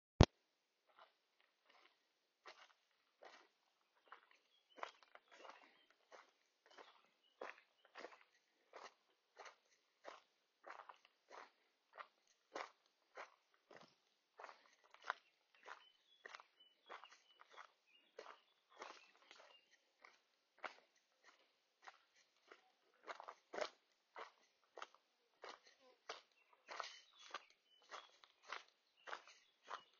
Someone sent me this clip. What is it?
Soundscapes > Nature
Footsteps through a wood
Walking on a dirt path through the woods at the beck in Keighley, West Yorkshire
walking, nature, field-recording, birds, footsteps